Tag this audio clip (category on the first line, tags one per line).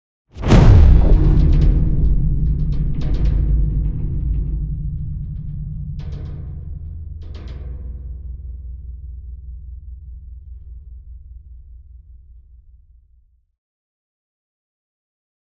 Sound effects > Other
audio
blunt
cinematic
collision
crash
design
effects
explosion
force
game
hard
heavy
hit
impact
percussive
power
rumble
sfx
sharp
shockwave
smash
sound
strike
thudbang
transient